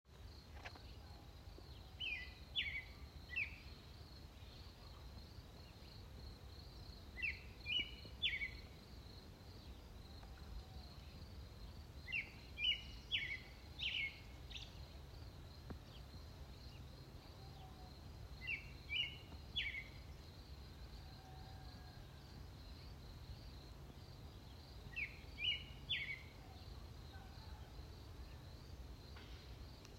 Soundscapes > Nature
Single robin? Singing on top of the tree next door 06/19/2024
nature, birdsong, bird, rural